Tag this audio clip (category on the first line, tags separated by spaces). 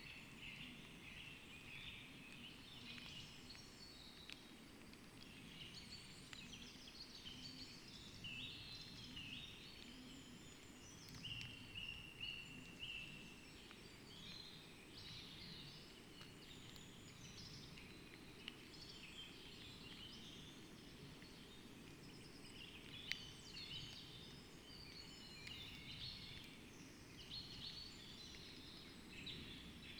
Soundscapes > Nature
phenological-recording weather-data alice-holt-forest modified-soundscape soundscape field-recording data-to-sound nature raspberry-pi Dendrophone natural-soundscape artistic-intervention sound-installation